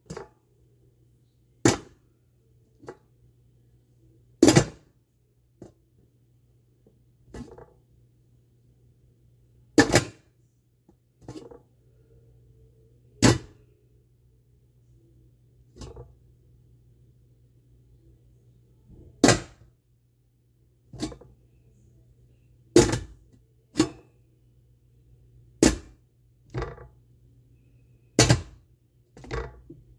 Sound effects > Objects / House appliances
METLHndl-Samsung Galaxy Smartphone Pan, Lid, Open, Close Nicholas Judy TDC
A pan lid opening and closing.
close, Phone-recording, open, foley, pan, lid